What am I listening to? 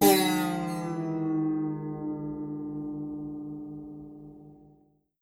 Sound effects > Other
TOONBoing-Samsung Galaxy Smartphone, CU Guitar, Boing, Down Nicholas Judy TDC
A guitar boing down.
boing, Phone-recording